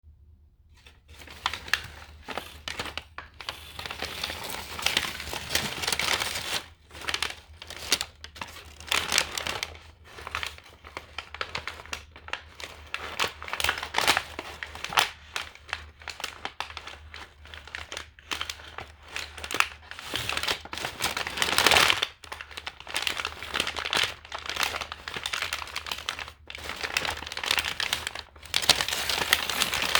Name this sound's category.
Sound effects > Objects / House appliances